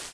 Sound effects > Experimental
Static cutting out
Made from simply distorting an audio file, works well in a scene if you need the video to cut from a display. Used in my visual novel: R(e)Born_ Referenced with AKG K240.
glitch, noise, corruption, electronic, static, cut